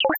Sound effects > Electronic / Design
MOVING GLOSSY MULTIMEDIA DING
EXPERIMENTAL, CHIPPY, CIRCUIT, SYNTHETIC, OBSCURE, DING, HIT, BOOP, HARSH, UNIQUE, ELECTRONIC, SHARP, BEEP, INNOVATIVE, COMPUTER